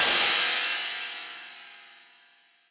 Instrument samples > Percussion
Synthed only with a preset of the Pacter Plugin in FLstudio Yes,only the preset called '' Cymbalism '' I just twist the knobs a night then get those sounds So have a fun!